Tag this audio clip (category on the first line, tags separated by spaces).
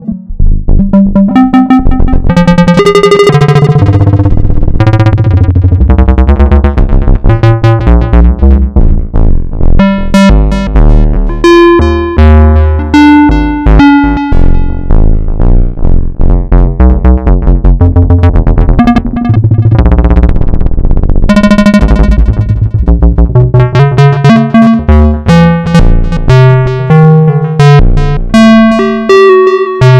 Sound effects > Electronic / Design
experimental Ripplemaker ipad bounces electronic generative